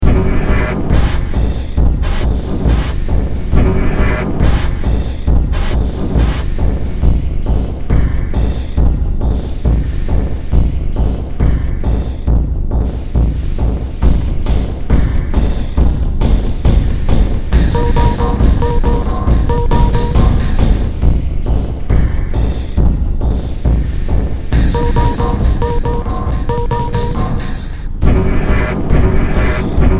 Music > Multiple instruments
Short Track #3424 (Industraumatic)
Ambient, Cyberpunk, Horror, Industrial, Noise, Sci-fi, Soundtrack, Underground